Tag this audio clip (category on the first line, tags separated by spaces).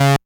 Sound effects > Experimental
alien analog analogue bass basses complex electro fx korg oneshot robotic sample trippy